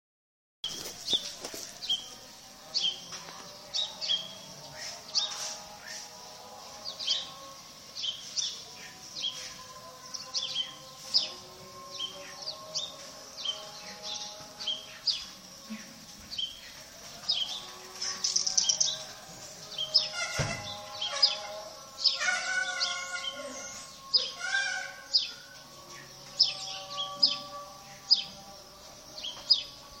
Soundscapes > Urban
A street in Fes - Morocco
I recorded this sound in Fes (Morocco) looking down on a narrow street from my window, using my mobile phone.
field-recording, Morocco